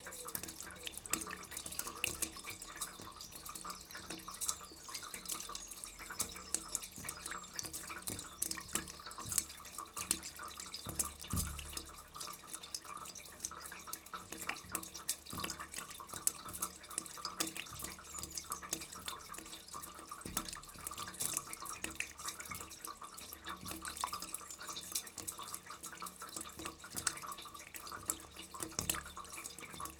Sound effects > Natural elements and explosions
pluie tuyau Gouttière 2 Villy
Water flowing at the output of a pipe, close up recording, water drops hit the zinc, birds sing in the background.
flow, drizzle, ecoulement, drops, zinc, birds, pipe, pluie, gutter, rain, dripping, gouttiere, water, drain-pipe, field-recording, downspout, crachin